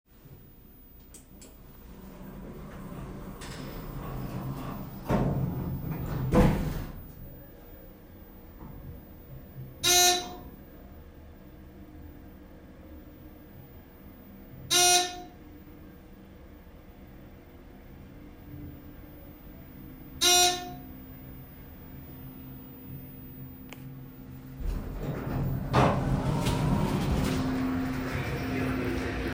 Sound effects > Other mechanisms, engines, machines
Elevator ride with doors closing, elevator moving, and the doors opening on arrival to desired floor. Recorded at Holiday Inn in Niagara Falls, NY.